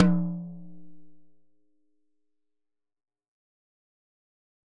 Music > Solo percussion
Hi Tom- Oneshots - 49- 10 inch by 8 inch Sonor Force 3007 Maple Rack
acoustic, beat, beatloop, beats, drum, drumkit, drums, fill, flam, hi-tom, hitom, instrument, kit, oneshot, perc, percs, percussion, rim, rimshot, roll, studio, tom, tomdrum, toms, velocity